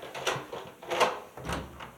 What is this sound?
Sound effects > Objects / House appliances
Generic apartment unit door being unlocked. Recorded with my phone.